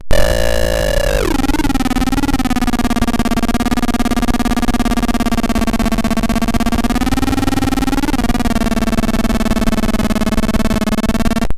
Sound effects > Electronic / Design
Optical Theremin 6 Osc dry-048
Sci-fi, Bass, Glitch, Otherworldly, Digital, Trippy, Scifi, Handmadeelectronic, Sweep, Electronic, noisey, Noise, FX, Electro, Synth, Theremins, Optical, Robot, Robotic, Analog, Infiltrator, Dub, Theremin, Experimental, Glitchy, DIY, Instrument, SFX, Spacey, Alien